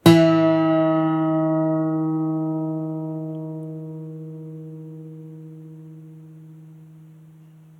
Instrument samples > String
broken guitar
Off-tune pluck of a guitar string. Recorded with my phone.
chord, guitar, string